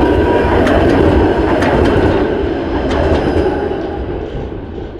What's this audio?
Sound effects > Vehicles
Tram00044195TramPassing
Audio of a tram passing nearby. Recording was taken during winter. Recorded at Tampere, Hervanta. The recording was done using the Rode VideoMic.
city, field-recording, tram, tramway, transportation, vehicle, winter